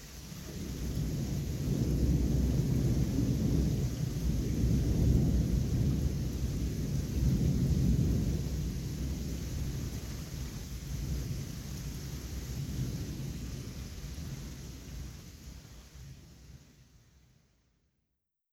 Sound effects > Natural elements and explosions
THUN-Samsung Galaxy Smartphone, MCU Distant Rumble, Distant Heavy Rain Nicholas Judy TDC
A distant thunder rumble and distant rain.